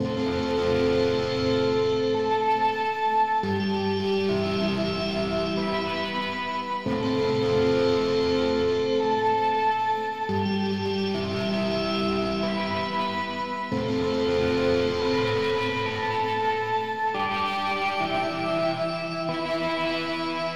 Music > Solo instrument
Distorted Melody loop
Made in Renoise with in-built effects like distortion, delay, stereo enhancer with bunch more
Space, Bells, bright